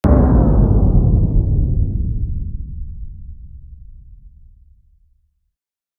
Sound effects > Electronic / Design
Power Down 3
all-stop, computer-power-down, deactivate, deactivate-machine, engine-deactivate, hark-a-liar, here, machine-deactivate, machine-off, machine-power-down, machine-shut-down, power-down, powering-down, power-off, power-outage, remove-power, shut-down, slow, slow-down, tags, turn-off, turn-off-machine, Type, warp-drive-deactivate